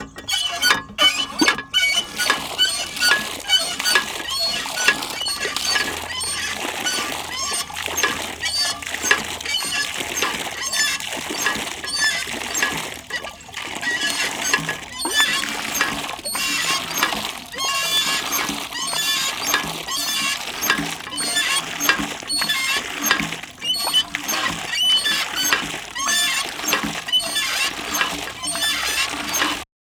Sound effects > Other mechanisms, engines, machines
Old hand pump ,is perfect for cinematic uses,video games. Effects recorded from the field.